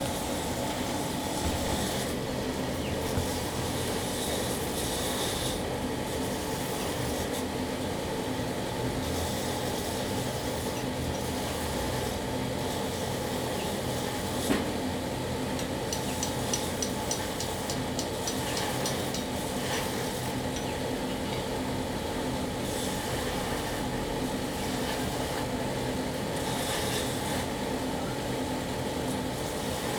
Soundscapes > Urban
Blacksmith nearby 1
Furnace noise. Grinding. Hammering. The blacksmith instructs his apprentice. Some birdsong in the background.
soundscape, ambience, field-recording, blacksmith